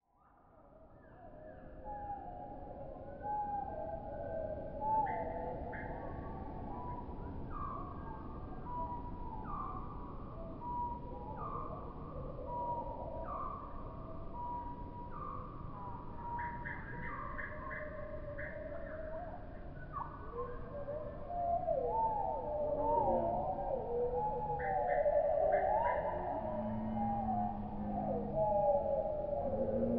Soundscapes > Synthetic / Artificial
R4 00491 EXP01 Jurassic world Alien planet
Jurassic world / alien planet atmosphere. I slowed down this nice dawn chorus 4 times, then added a couple of dBs and a slight noise reduction... Voila ! Here is the wonderful sound of a Jurassic world, or a spooky alien planet atmosphere, full of strange creatures ! ;-) Hope you’ll enjoy !